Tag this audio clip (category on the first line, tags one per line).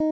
Instrument samples > String
arpeggio
cheap
design
guitar
sound
tone